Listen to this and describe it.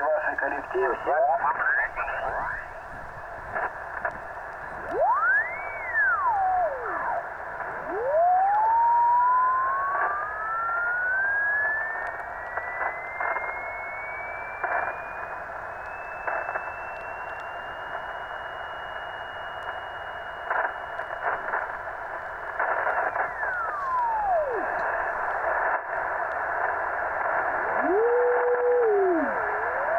Sound effects > Other mechanisms, engines, machines
Carrier frequency in the radio
Smooth tuning on the radio in SSB mode. The carrier frequency is audible against the background of interference
air, noise, frequency, ham, interference, tuning, ssb, radiostation, radio